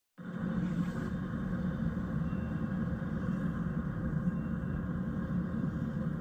Sound effects > Vehicles
final bus 26
bus, finland, hervanta